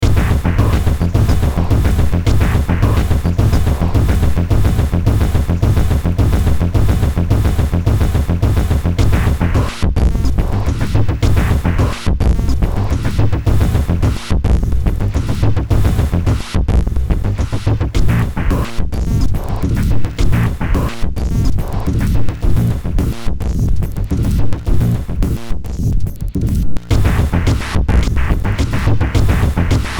Music > Multiple instruments
Short Track #2958 (Industraumatic)
Ambient, Cyberpunk, Games, Horror, Industrial, Noise, Sci-fi, Soundtrack, Underground